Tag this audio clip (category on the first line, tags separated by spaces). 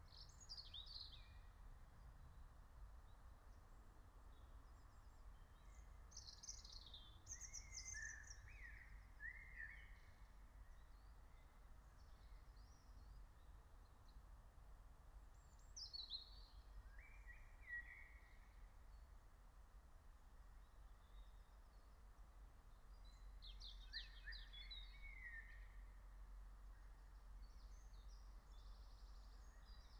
Soundscapes > Nature
meadow raspberry-pi nature natural-soundscape